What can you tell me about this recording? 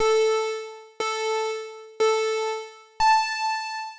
Sound effects > Other
Countdown for race, game start
Made with Analog Lab E.P 7070 Do whatever you want with it.
321, count-down, countdown, race, racing, start, synth, synthesized